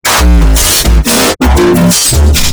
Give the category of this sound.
Music > Solo percussion